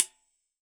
Soundscapes > Other
An IR Impulse Response of the inside of a washing machine. Speaker on the bottom of the drum, mic on mid-hight motor side. Made by experimenting with a overly complicated "test tone" of sine-sweeps, and bursts of noise/tones. I used a 10€ speaker and a Dji mic 3. Testing that small setup by placing it in a fridge, oven and washing machine. Deconvoluted and then trimmed/faded out in audacity. You can use an IR with a convolution plugin/vst to replicate tones or reverbs/delays. 2025 12 24 Albi France.